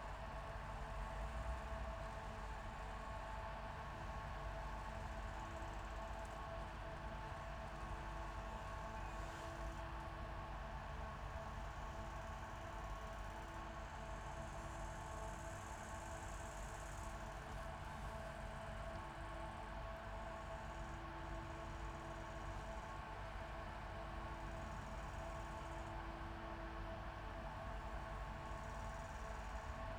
Soundscapes > Urban
Dunkerque StationEpuration
Sewage treatment plant in Dunkerque; general industrial atmosphere, with a few harmonics. A Bicycle is passing at +/- 2'. Some cicadas in the background. EM272 electret omni mics in AB stereo
atmosphere, Dunkerque, industrial, industry